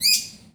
Sound effects > Animals
Making assumptions about a caged bird, recorded Jan 28, 2025 at the Affandi Museum in Yogyakarta (aka Yogya, Jogjakarta, Jogja) using a Moto G34, cleaned up in RX and Audacity.